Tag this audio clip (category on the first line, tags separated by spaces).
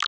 Instrument samples > Percussion
Botanical EDM Organic Snap